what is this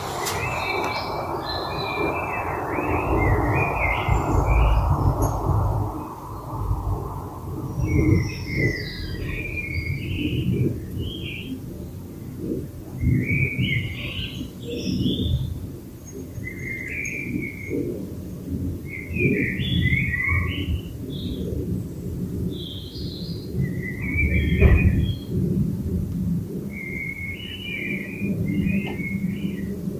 Soundscapes > Nature
A recording of bird song made in my garden.
birds, bird, spring, nature